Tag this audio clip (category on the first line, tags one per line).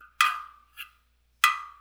Sound effects > Objects / House appliances
scrape
can
sfx
household